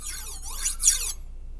Sound effects > Objects / House appliances
knife and metal beam vibrations clicks dings and sfx-073
Beam, Clang, ding, Foley, FX, Klang, Metal, metallic, Perc, SFX, ting, Trippy, Vibrate, Vibration, Wobble